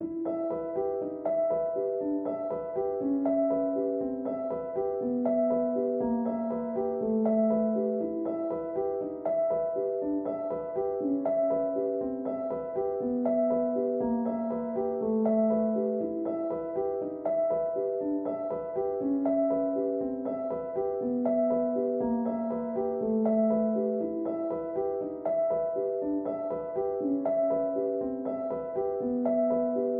Music > Solo instrument
Piano loops 188 octave down long loop 120 bpm
simplesamples, 120bpm, piano, reverb, samples, 120, simple, free, loop, pianomusic, music